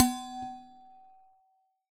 Sound effects > Objects / House appliances
Resonant coffee thermos-021
percusive, recording, sampling